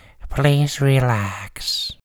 Speech > Solo speech
please relax
calm, man, human, voice, male